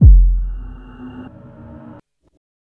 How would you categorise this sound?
Sound effects > Electronic / Design